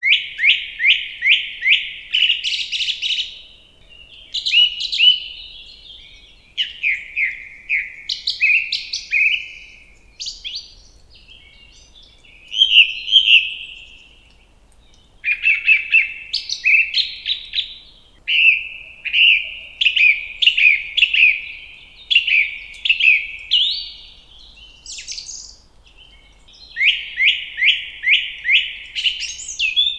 Sound effects > Animals
Beautiful birdsong 8
calm, background, rural, peaceful, environmental, atmosphere, singing, Poland, soundscape, forest, European-forest, natural, ambience, birdsong, outdoor, field-recording, birds, wild